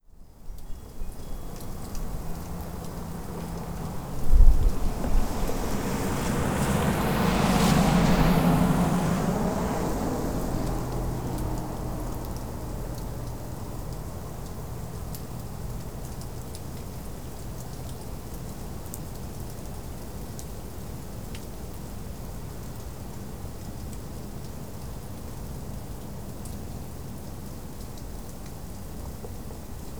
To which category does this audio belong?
Soundscapes > Nature